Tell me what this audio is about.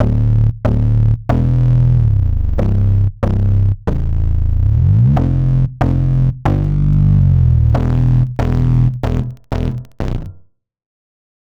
Music > Solo instrument
93bpm - PsyTechBass22 Dminor - Master
2 of 3 Variant 2 of PsyTechBass.
bass, distorted, hard, Sample, synth